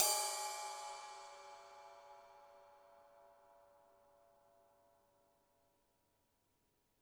Music > Solo percussion
crah bell2
crash, cymbals, drums